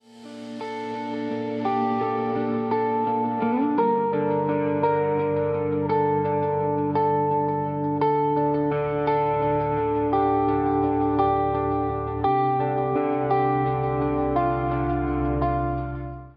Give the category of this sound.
Music > Other